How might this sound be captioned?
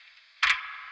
Music > Solo percussion
Reverb guitar clap
clap, techno